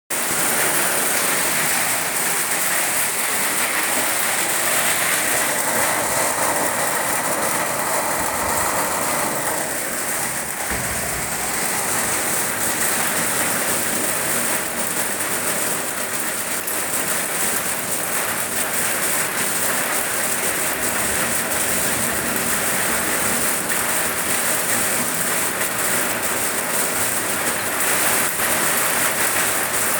Sound effects > Other
Heavy Rain on Tinroof
This sound was recorded from Oppo F15
rain, raindrops, roof